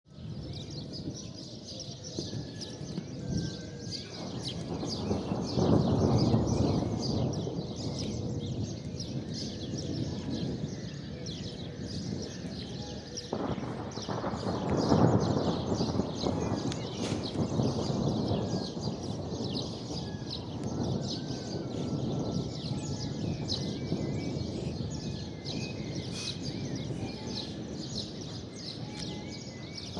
Soundscapes > Nature
Thunderstorm-Sound-2
Recorded this just right before it rains, great for making a video or even as an Envirement audio for your games !!